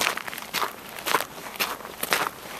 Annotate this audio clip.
Sound effects > Human sounds and actions
Walking tour. This sound was recorded by me using a Zoom H1 portable voice recorder. Tempo 92 bpm.
asphalt, footsteps, wet, walk, steps, gravel